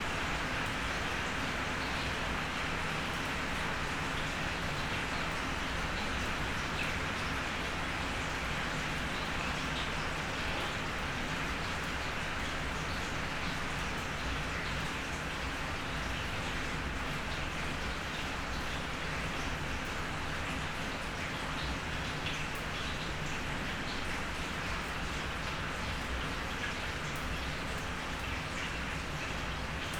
Sound effects > Natural elements and explosions
250723 234255 PH Heavy to medium rain on small backyard and metallic roof
Heavy to medium rain on small backyard and metallic roof. Recorded in July 2025 with a Zoom H6essential (built-in XY microphones). Fade in/out applied in Audacity.
cement, drops